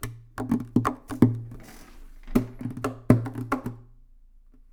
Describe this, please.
Music > Solo instrument
acoustic guitar tap 1
acosutic, chord, chords, dissonant, guitar, instrument, knock, pretty, riff, slap, solo, string, strings, twang